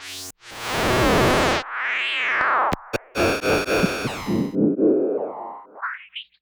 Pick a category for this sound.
Sound effects > Experimental